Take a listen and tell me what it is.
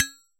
Sound effects > Objects / House appliances
Empty coffee thermos-006
sampling, recording, percusive